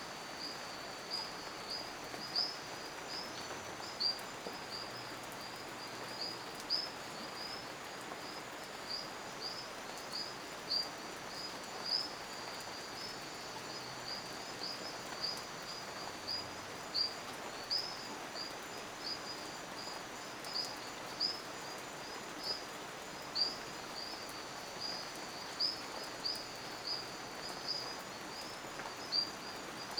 Soundscapes > Nature
Gentle rain turns to moderately heavy rain in the evening, before becoming gentle again. Crickets and other insects can be heard. While this is a somewhat rural area, human sounds are possible as well.